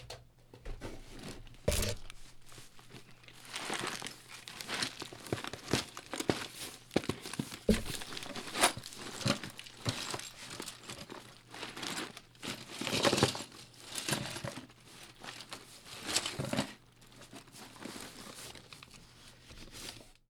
Sound effects > Objects / House appliances
Glass being manipulated in a box with thunks
I used this for a monster who broke through a glass window. The thunks are supposed to be similar to the monster hitting the window frame. Would work well for walking on glass as well. Made in studio, no background noise.
broken, crunch, shattered, stir, walking